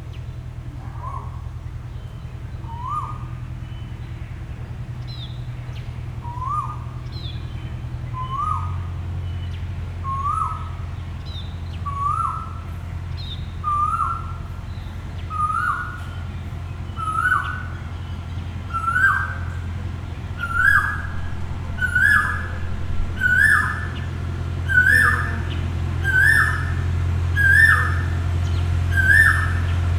Sound effects > Animals
Tu hú bird at relative's neighbor's house. Record use Zoom H4n Pro 2025.01.16 10:35